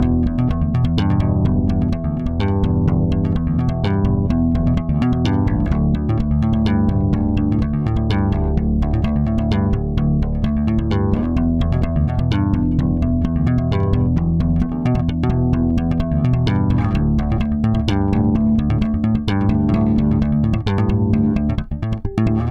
Music > Solo instrument
tapppy slap riff 1
bass, bassline, basslines, blues, chords, chuny, electric, electricbass, funk, fuzz, harmonic, harmonics, low, lowend, note, notes, pick, pluck, riff, riffs, rock, slap, slide, slides